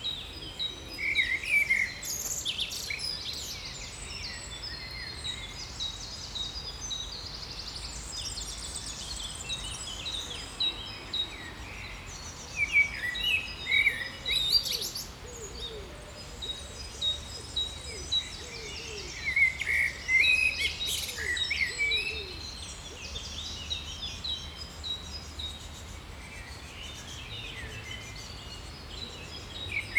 Soundscapes > Nature
2025c0519 12h58 Albi Table vers aire de jeu lEchapee Verte
Subject : Date : 2025 05/May 19 around 13h Location : Albi 81000 Tarn Occitanie France Weather : Hardware : Zoom H2n on a "gooseneck/clamp" combo for action cams. Processing : Trim and normalised.
81000, albi